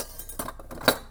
Sound effects > Objects / House appliances
knife and metal beam vibrations clicks dings and sfx-093
Perc, ding, metallic, Beam, Wobble, Foley, ting, Klang, Trippy, Metal, FX, Vibration, Vibrate, SFX, Clang